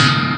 Instrument samples > Percussion
important notification: I like it. It's a sweet bell. Remind me to use it. Unrealistic bass shortlengthed bellride as a component subsample of other samples. ride bell bassbell ridebell bellride cup metal-cup bellcup crashcup cupride ping cymbal cymbell Zildjian Sabian Meinl Paiste Istanbul-Agop Istanbul click-crash
Paiste, Zildjian, Sabian, Istanbul, bell, ride, Meinl, ridebell, metal-cup, cupride, ping, cymbal, crashcup, bellride, click-crash, Istanbul-Agop, bellcup, cymbell, bassbell, cup